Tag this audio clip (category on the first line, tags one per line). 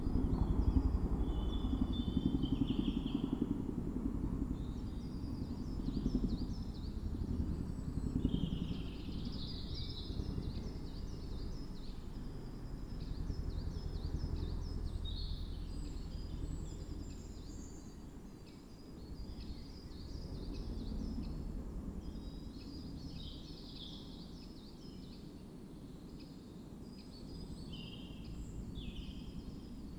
Soundscapes > Nature
Dendrophone
soundscape